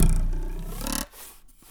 Sound effects > Other mechanisms, engines, machines
metal shop foley -133
bang, wood, oneshot, thud, foley, little, sound, metal, tools, rustle, sfx, shop, pop, percussion, tink, strike, fx, perc, bam, knock, bop, crackle, boom